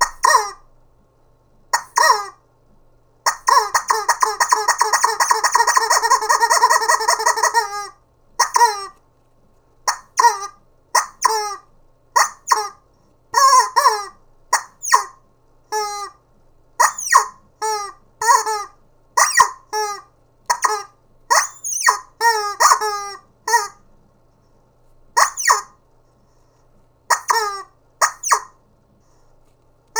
Sound effects > Objects / House appliances
TOONSqk-Blue Snowball Microphone, CU Rubber Chicken Squeaks Nicholas Judy TDC
A rubber chicken squeaking.
chicken, horn, rubber, squeak, cartoon, rubber-chicken